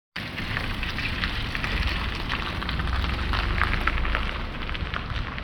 Sound effects > Vehicles
new ford fiesta
Car, Tampere, field-recording